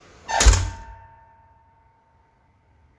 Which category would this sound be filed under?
Sound effects > Other